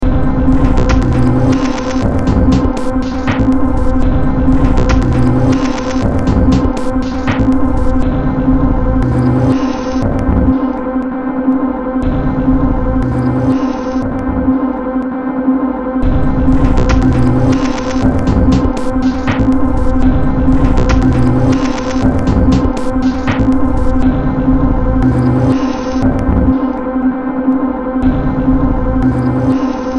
Music > Multiple instruments
Demo Track #3496 (Industraumatic)
Ambient, Cyberpunk, Games, Horror, Industrial, Noise, Sci-fi, Soundtrack, Underground